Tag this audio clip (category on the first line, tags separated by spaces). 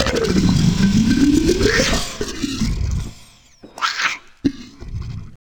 Sound effects > Experimental
Alien bite Creature demon devil dripping fx gross grotesque growl howl Monster mouth otherworldly Sfx snarl weird zombie